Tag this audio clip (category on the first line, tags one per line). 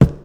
Sound effects > Objects / House appliances
hollow
fill
drop
bucket
household
tip
foley
garden
pour
kitchen
debris
spill
slam
tool
handle
object